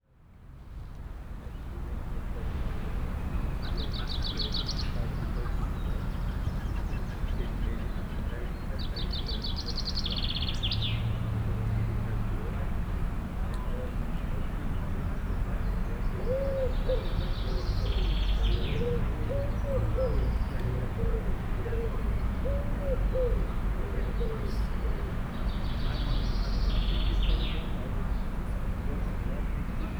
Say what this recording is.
Soundscapes > Nature
Cardiff - Stone Circle, Bute Park 01
City, urban